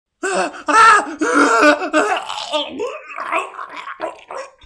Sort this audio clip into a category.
Sound effects > Human sounds and actions